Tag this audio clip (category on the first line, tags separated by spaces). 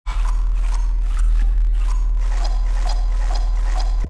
Sound effects > Electronic / Design
Abstract Alien Analog Automata Buzz Creature Creatures Digital Droid Drone Experimental FX Glitch Mechanical Neurosis Noise Otherworldly Robotic Spacey Synthesis Trippin Trippy